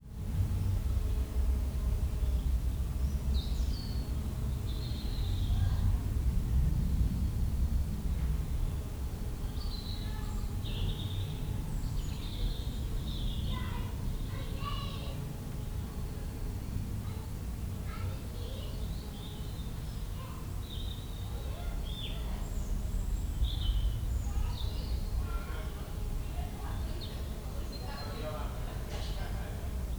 Soundscapes > Nature
Ambient garden sounds, children and plane passing, birdsong and bees
Ambient garden sounds in the south-east of England, April UK, 5pm, children and a plane passing, birdsong and bees
garden, ambient, woodland, ambience, insects, bees, aeroplane, nature, forest, plane, birds, field-recording, spring, airplane, birdsong, bird